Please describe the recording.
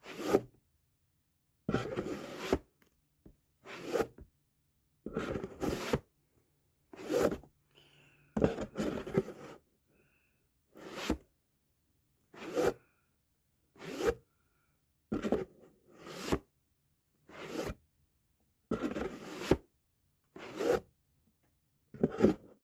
Sound effects > Objects / House appliances
OBJCont-Samsung Galaxy Smartphone Case, Box, Slide Nicholas Judy TDC
Box case sliding.
box, case, close, open, Phone-recording, slide